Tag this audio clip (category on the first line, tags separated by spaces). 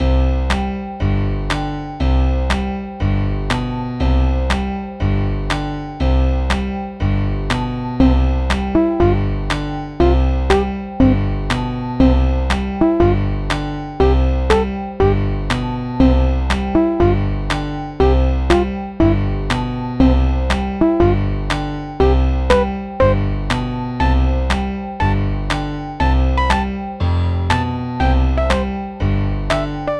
Music > Multiple instruments
120bpm calm fun funny game joyful loop meme piano soundtrack